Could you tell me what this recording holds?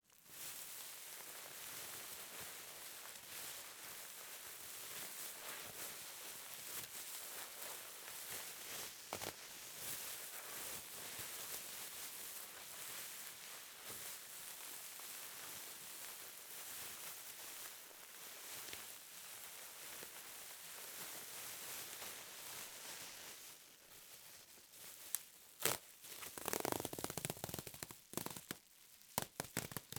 Sound effects > Objects / House appliances
A recording of bubble wrap being ripped, squashed, squeezed and popped. Recorded using Zoom F3. Rode NTG4. Dual Mono.
bubble-wrap, handled, popped, ripped, rustling, squashed, squeezed